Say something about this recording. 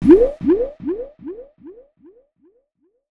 Sound effects > Electronic / Design
Bloop Delay
Sounds like a metal board being wobbled or a bubble underwater. Bloop Bloop
bloop, bubble, delay, synth, wobble